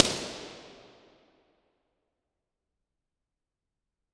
Soundscapes > Other
I&R Esperaza's church - Altar side - ECM999
Subject : An Impulse and response (not just the response.) of Esperaza's church altar side right at the step of it. Date YMD : 2025 July 12 Location : Espéraza 11260 Aude France. Recorded with a Superlux ECM 999 Weather : Processing : Trimmed in Audacity.
11260, ballon, balloon, Church, convolution, Convolution-reverb, ECM999, Esperaza, FR-AV2, FRAV2, Impulse, Impulseandresponse, IR, omni, pop, Response, Reverb, Superlux, Tascam